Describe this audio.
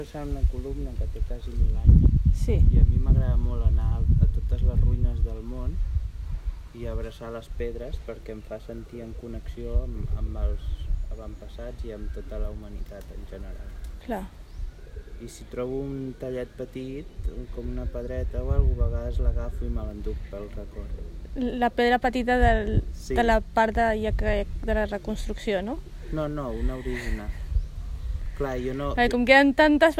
Soundscapes > Urban
Conversation in Sukhothai, Thailand (March 4, 2019)
Casual conversation recorded in Sukhothai, Thailand. Features natural speech, subtle laughter, and faint street ambiance.